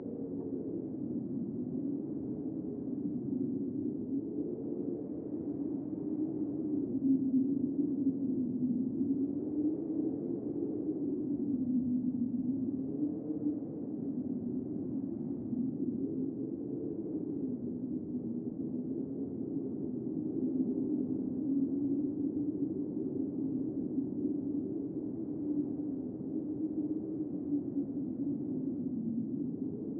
Sound effects > Electronic / Design
WINDDsgn Howling Wind 3 ZAZZ
Nature, Wind, Windy